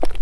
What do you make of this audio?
Sound effects > Other mechanisms, engines, machines
shop foley-036
metal, strike, bop, fx, rustle, bang, perc, oneshot, sfx, thud, pop, wood, shop, little, boom, percussion, sound